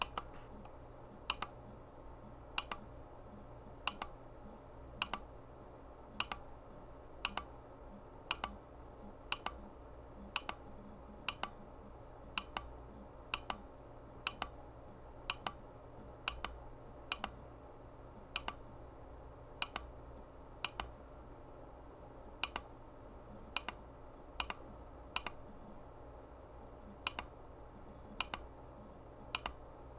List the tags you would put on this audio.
Sound effects > Objects / House appliances
Blue-brand click logitech-m185 trackball Blue-Snowball logitech foley

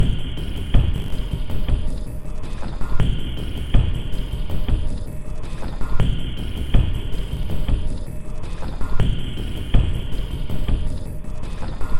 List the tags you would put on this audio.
Instrument samples > Percussion
Loopable Loop Dark Alien Ambient Packs Underground Samples Drum Soundtrack Weird Industrial

